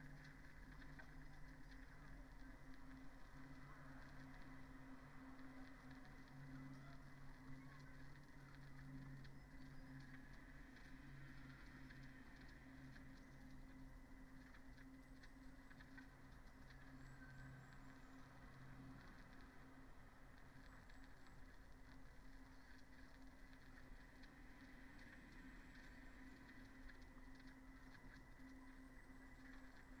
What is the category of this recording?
Soundscapes > Nature